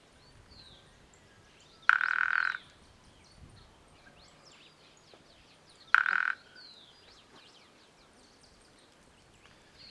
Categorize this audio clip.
Sound effects > Animals